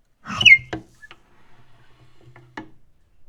Sound effects > Objects / House appliances
Subject :A fireplace Door focusing on the lever handle opening. Date YMD : 2025 04 Location : Gergueil France Hardware : Tascam FR-AV2 and a Rode NT5 microphone in a XY setup. Weather : Processing : Trimmed and Normalized in Audacity. Maybe with a fade in and out? Should be in the metadata if there is.